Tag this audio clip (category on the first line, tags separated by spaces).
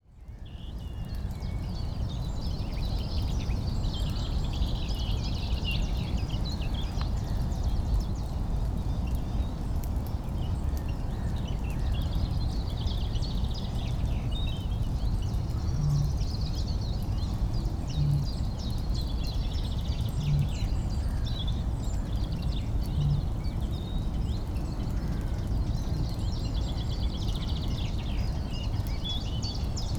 Soundscapes > Nature
ambience birds field recording nature